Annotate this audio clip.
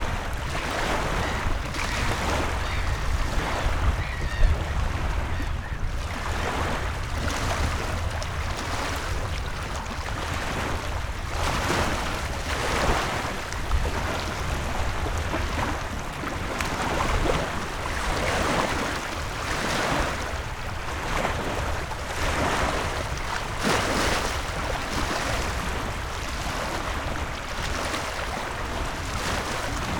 Soundscapes > Nature
Ambient recording in a lake shore. Medium wind. Some seagulls and dogs might be heard.